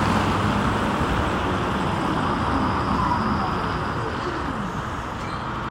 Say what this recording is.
Sound effects > Vehicles
Tram arrival and departure sequences including door chimes and wheel squeal. Wet city acoustics with light rain and passing cars. Recorded at Sammonaukio (19:00-20:00) using iPhone 15 Pro onboard mics. No post-processing applied.